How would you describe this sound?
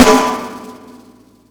Instrument samples > Percussion
Goregrind(sulfuric cautery) snare
snare, ping, Tight, High